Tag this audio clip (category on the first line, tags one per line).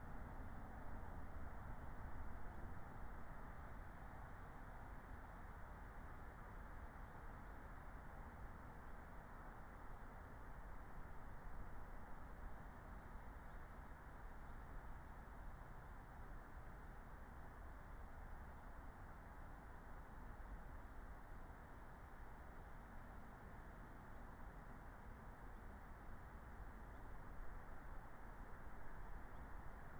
Soundscapes > Nature
raspberry-pi
nature
alice-holt-forest
natural-soundscape
phenological-recording
meadow
soundscape
field-recording